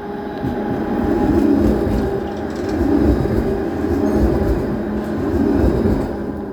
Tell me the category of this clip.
Sound effects > Vehicles